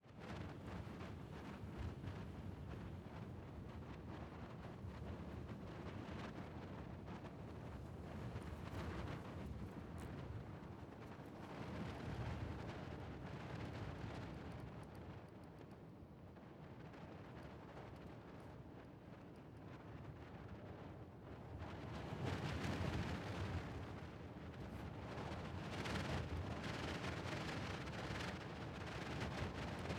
Sound effects > Natural elements and explosions
A dripping pan is set in a appropriated place under a stong wind during low tide. Sounds like open window in car, without motor!